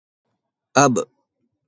Sound effects > Other
ba-sisme
In Tajwid and Arabic phonetics, the place where a letter is pronounced is called "makhraj" (مَخْرَج), which refers to the specific point in the mouth or throat where a sound originates. The correct identification of the makhraj is crucial to ensure accurate pronunciation of Arabic letters, especially in the recitation of the Qur'an. In Tajwid and Arabic phonetics, the place where a letter is pronounced is called "makhraj" (مَخْرَج), which refers to the specific point in the mouth or throat where a sound originates. The correct identification of the makhraj is crucial to ensure accurate pronunciation of Arabic letters, especially in the recitation of the Qur'an. This is my own voice. I want to put it as my audio html project.
arabic, male, sound, vocal, voice